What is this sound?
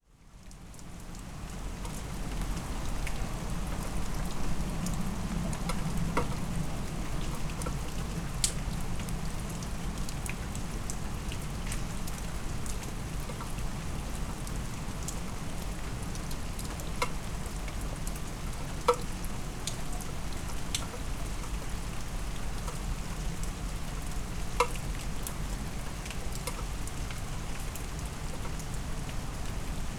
Soundscapes > Urban
Early morning rainfall recorded in an urban area of Leicestershire, England.
morning, raindrops, weather, drops, downpoor, rainfall, terrential, drips, rain, raining, shower